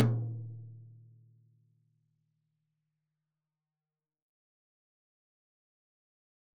Music > Solo percussion
Med-low Tom - Oneshot 68 12 inch Sonor Force 3007 Maple Rack
acoustic, beat, drum, drumkit, drums, flam, kit, loop, maple, Medium-Tom, med-tom, oneshot, perc, percussion, quality, real, realdrum, recording, roll, Tom, tomdrum, toms, wood